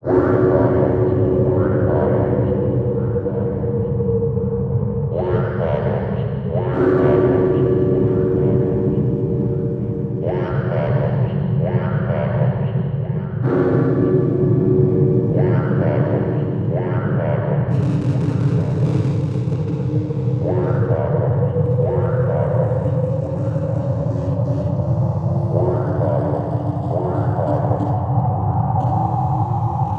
Music > Other
We have a problem in the space
This is created with the iPad app SoundScaper by Igor Vasiliev.
galaxy, problem, shuttle, Soundscaper, space